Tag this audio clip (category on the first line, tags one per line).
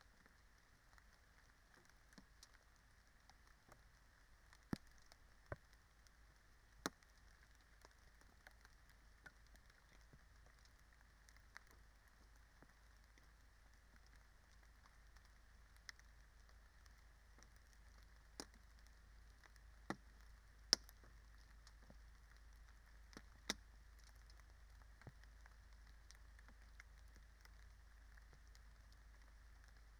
Soundscapes > Nature
field-recording
natural-soundscape
nature
raspberry-pi
soundscape